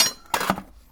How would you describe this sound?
Sound effects > Other mechanisms, engines, machines
metal shop foley -069
bop, tools, percussion, strike, fx, shop, pop, wood, sound, boom, oneshot, sfx, foley, thud, crackle, little, bang, bam, knock, rustle, metal, perc, tink